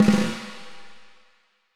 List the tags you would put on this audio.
Music > Solo percussion
percussion
acoustic
beat
fx
hit
oneshot
rim
drums
snare
drum
sfx
reverb
snares
snareroll
roll
drumkit
realdrum
rimshot
snaredrum
crack
processed
brass
flam
kit
realdrums
rimshots
ludwig
hits
perc

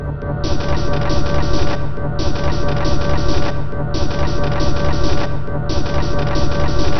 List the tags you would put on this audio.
Instrument samples > Percussion
Industrial
Loopable
Packs
Loop
Weird
Underground
Soundtrack
Ambient
Alien
Samples
Dark
Drum

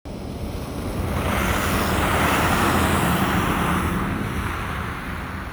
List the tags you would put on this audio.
Sound effects > Vehicles
bus
engine
vehicle

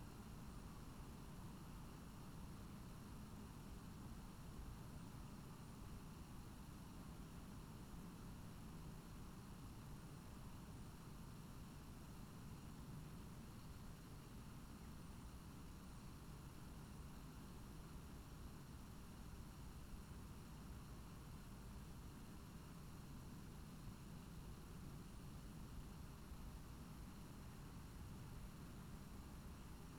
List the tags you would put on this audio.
Soundscapes > Nature
alice-holt-forest
phenological-recording
Dendrophone
data-to-sound
weather-data
natural-soundscape
field-recording
nature
raspberry-pi
artistic-intervention
soundscape
sound-installation
modified-soundscape

